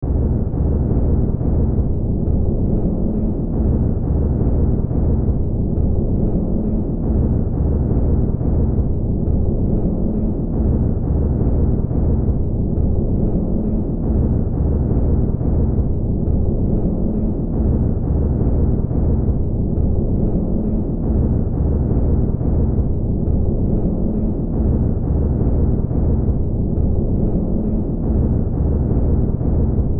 Soundscapes > Synthetic / Artificial
Looppelganger #150 | Dark Ambient Sound
Noise, Underground, Hill, Silent, Soundtrack, Sci-fi, Gothic, Drone, Games, Ambience